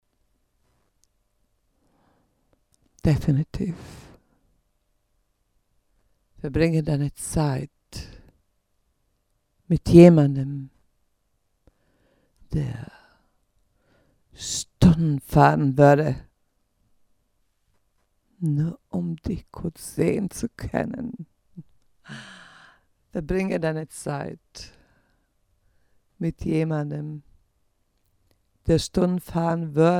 Speech > Solo speech
FOR FREE. verbirnge deine Zeit nur mit jemandem, der stunden fahrien würde nur um dich kurz sehen zu können. If you like, thank you !!!